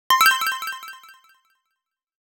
Sound effects > Electronic / Design
GAME UI SFX PRACTICE 4
Program : FL Studio, Purity